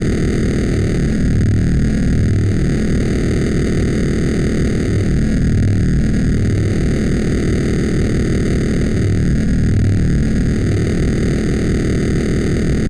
Sound effects > Other mechanisms, engines, machines
IDM Atmosphare14 (D note )
IDM, Industry, Machinery, Noise, Synthetic, Working